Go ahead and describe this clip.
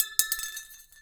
Sound effects > Other mechanisms, engines, machines
metal shop foley -232
bam, bang, boom, bop, crackle, foley, fx, knock, little, metal, oneshot, perc, percussion, pop, rustle, sfx, shop, sound, strike, thud, tink, tools, wood